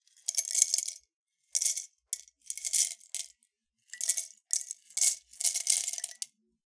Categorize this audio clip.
Sound effects > Natural elements and explosions